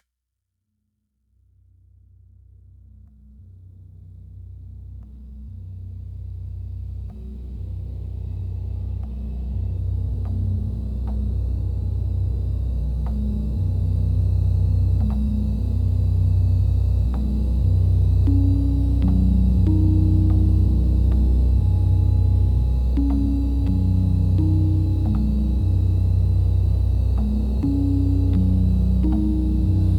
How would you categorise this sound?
Music > Multiple instruments